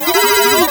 Instrument samples > Synths / Electronic
Distorted note made in Audacity
synth, note, stab, distortion, beep, electronic